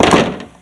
Sound effects > Objects / House appliances
door pool close1
Door being slammed shut. Recorded with my phone.
close, closing, door, shut